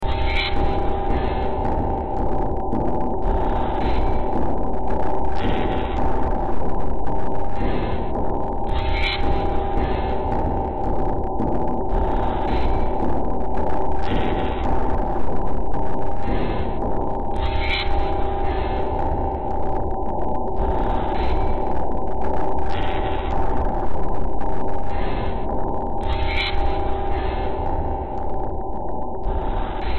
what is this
Music > Multiple instruments
Demo Track #2996 (Industraumatic)
Horror, Soundtrack, Ambient, Underground, Noise, Industrial, Sci-fi, Cyberpunk, Games